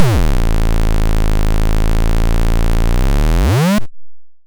Sound effects > Electronic / Design
Optical Theremin 6 Osc dry-005
Alien, Analog, Bass, Digital, DIY, Dub, Electro, Electronic, Experimental, FX, Glitch, Glitchy, Handmadeelectronic, Infiltrator, Instrument, Noise, noisey, Optical, Otherworldly, Robot, Robotic, Sci-fi, Scifi, SFX, Spacey, Sweep, Synth, Theremin, Theremins, Trippy